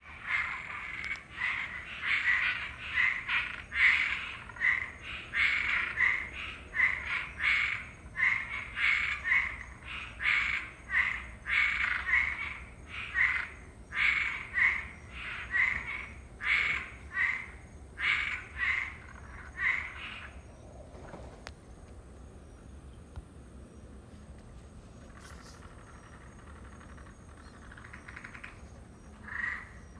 Soundscapes > Nature
Sounds like to animals possibly frogs talking to each other he recording came from a family members house in Florida at 3 am in the morning, it was recorded with an iPhone.